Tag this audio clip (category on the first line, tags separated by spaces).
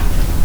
Sound effects > Other mechanisms, engines, machines
bam bang boom bop crackle foley fx knock little metal perc percussion pop rustle sfx shop sound strike thud tink tools wood